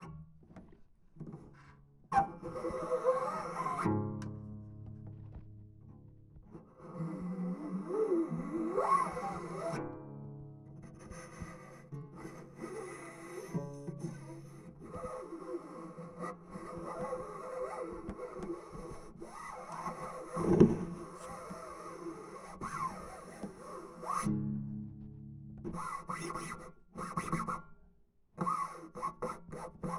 Instrument samples > Percussion
MUSCStr-Contact Mic Slide Beat on the strings SoAM Sound of Solid and Gaseous Pt 1
bow, cello, percussive, slide